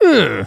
Speech > Other
Man Disappointment Interjection
Disappointed grunt. Perhaps he died in a very disappointing way. Male vocal recorded using Shure SM7B → Triton FetHead → UR22C → Audacity → RX → Audacity.
sad, emotion, yell, pain